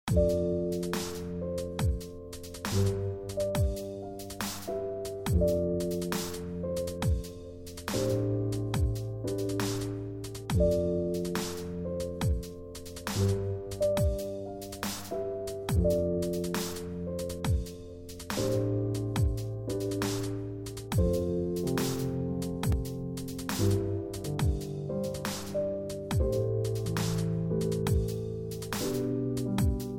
Music > Multiple instruments
music beats 6
Music song track with beats .
Hop, Symphony, Melody, Abstract, Music, Beat, Rhythm, Loop